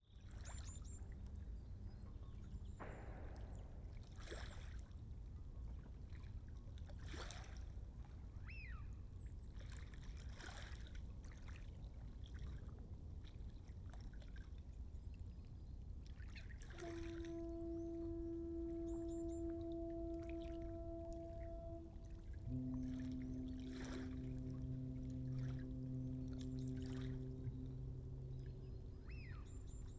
Soundscapes > Nature
Another recording from one of my favourite places; down by the shore next to Southampton water, alongside the nature reserve. This time on a foggy morning. You can hear the waves, birds on the water, distant foghorns and occasional banging and crashing from the docks. There is also a mixed set of dock related background sounds, rumbling, humming, etc. A car drove past in the middle of the recording, so I edited it out. I think it still works as a record of what I heard. Recorded on a Zoom F3 with clippy mics.